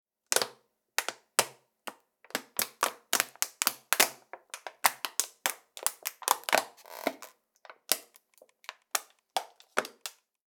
Sound effects > Objects / House appliances
Drink, Bottle, Plastic, Click, Close
Recorded with a Tascam DR-05X. Thank you!
Bottle, Clicks, Plastic